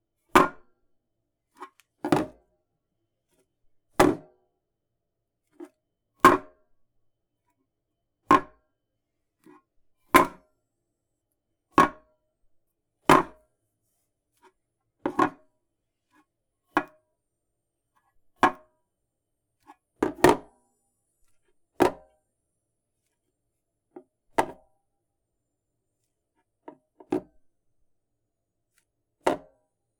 Sound effects > Objects / House appliances
tin can full
Picking up and dropping a full unopened tin can on a countertop multiple times.
slide, full, drop, grab, can, tin, bounce